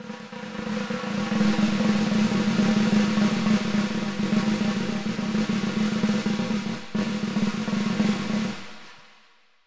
Music > Solo percussion
snare Processed - harder roll build - 14 by 6.5 inch Brass Ludwig
acoustic, brass, crack, drumkit, drums, flam, fx, hit, hits, kit, ludwig, oneshot, perc, percussion, processed, realdrum, realdrums, reverb, rim, roll, sfx, snare, snaredrum, snareroll